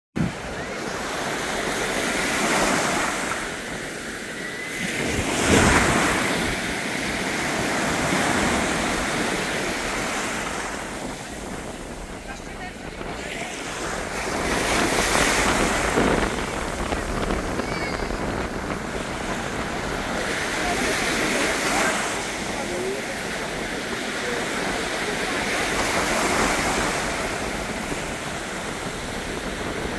Soundscapes > Nature
📍 Gdańsk 🌊 BALTIC SEA 🔊 Sea Waves Soundscape 🌍 Poland
A unique recording of Baltic Sea captured in Gdansk, Poland. 🗓️ Date: 15.06.2024 🎙️ Details: Soundscape of the Baltic Sea taken during a warmer, sunny day in June.
baltic, field-recording, sea, soundscape, waves